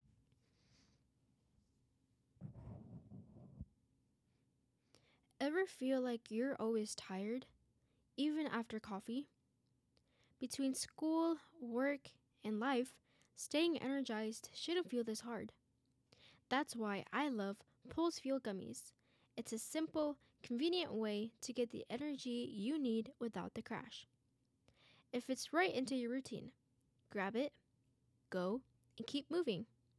Sound effects > Objects / House appliances
PulseFuel Gummies
A short spoken commercial script recorded for a student media project. Clear English narration intended for promotional or marketing use.
marketing, studio, reading, voice, clear, narration, promo, spoken, voiceover, commercial, script, advertisement, english, female, word